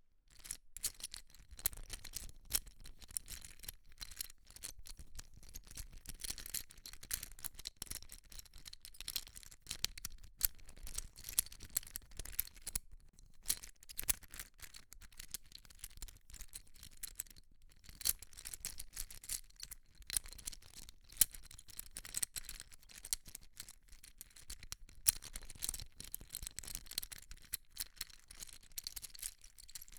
Sound effects > Objects / House appliances
Subject : Handling noises of a Fidget toy, a infinity cube thing. Date YMD : 2025 06 08 Location : Albi 81000 Tarn Occitanie France. Indoors Hardware : Tascam FR-AV2, Rode NT5. Weather : Night time Processing : Trimmed in Audacity.